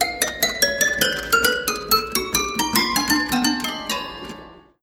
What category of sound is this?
Music > Solo instrument